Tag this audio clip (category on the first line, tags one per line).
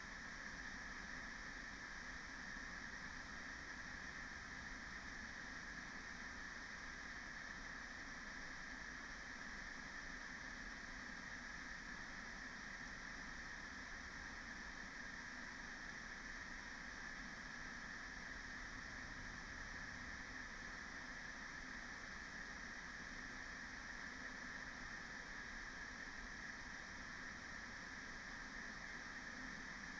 Soundscapes > Nature
artistic-intervention
soundscape